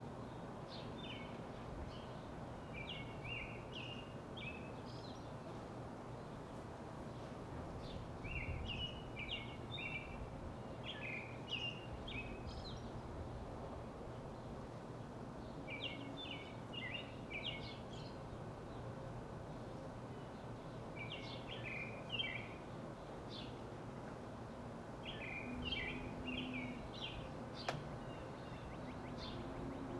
Soundscapes > Urban
exterior deck 20250428 3
Part of my "home ambience journal" pack, documenting an urban location over time under different environmental conditions. See the pack description for more about this location and its sonic characteristics. The ambiance of the rear deck of a house in the Central Hill neighborhood of Somerville, Massachusetts, in the Boston region. Recorded on 2025-04-28 at about 15:50. It was a sunny, clear spring day, temperature 75F / 24C, low humidity. Audible elements:
birds: American Robin (Turdus migratorius), Downy Woodpecker (Dryobates pubescens), possibly House Sparrow (Passer domesticus).
distant traffic from the elevated I-93 highway
wooden windchime
motor vehicles on nearby streets
motors and fans
wind
Recorded with a Sony PCM-D50, with mics in the 120-degree (wide) position, using a windscreen. Processing: 2-octave highpass filter at 80Hz.
ambiance, ambience, massachusetts, boston, spring, field-recording, birds, somerville-ma